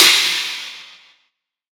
Instrument samples > Percussion
crash multi-Zildjian very long 1
unbassed: It has many wide low-pitch wide-notch-cuts to allow low-pitch audibility for future edits. Many Zildjian crashes and China crashes mixed together and rendered with stairway/staircase/escalier/stepped/layered/terraced/segmented fade out (progressively attenuated layerchunks). Avedis Zildjian Company (multicrash mixdown) tags: Avedis Zildjian Avedis-Zildjian China sinocymbal Sinocymbal sinocrash Sinocrash multicrash polycrash multi-China Meinl, Sabian, Paiste, Zultan bang clang clash crack crash crunch cymbal Istanbul low-pitchedmetal metallic shimmer sinocrash smash Soultone Stagg
Avedis, Avedis-Zildjian, bang, China, clang, clash, crack, crash, crunch, cymbal, Istanbul, low-pitchedmetal, Meinl, metallic, multi-China, multicrash, Paiste, polycrash, Sabian, shimmer, sinocrash, sinocymbal, smash, Soultone, Stagg, Zildjian, Zultan